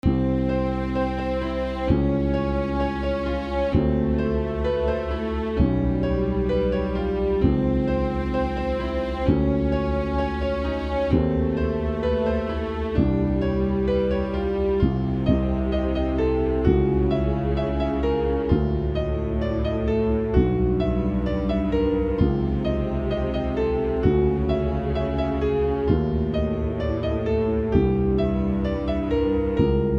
Music > Other
Electronic music - Glut
cumulatively, drama, film, horror, movie, piano, slow, suspense, tense, thiller, trailer